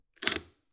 Sound effects > Other mechanisms, engines, machines
Milwaukee impact driver foley-009
a collection of sounds recorded in my wood shop in Humboldt County, California using Tascam D-05 and processed with Reaper
Foley, fx, Household, Impact, Mechanical, Motor, Scrape, sfx, Shop, Tool, Tools, Workshop